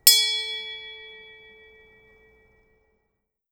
Sound effects > Objects / House appliances
BELLHand-Blue Snowball Microphone, CU Large, Strike Nicholas Judy TDC
A large handbell strike.
bell, Blue-brand, Blue-Snowball, hand, handbell, large, strike